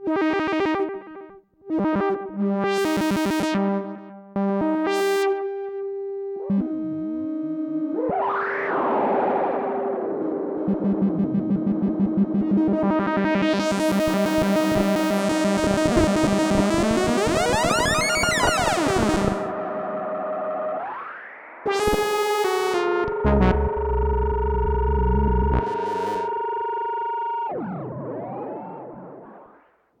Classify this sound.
Sound effects > Experimental